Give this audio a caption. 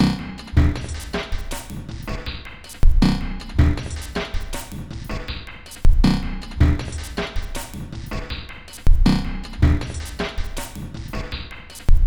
Instrument samples > Percussion
This 159bpm Drum Loop is good for composing Industrial/Electronic/Ambient songs or using as soundtrack to a sci-fi/suspense/horror indie game or short film.
Loop, Packs, Underground